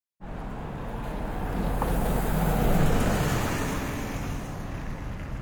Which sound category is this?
Sound effects > Vehicles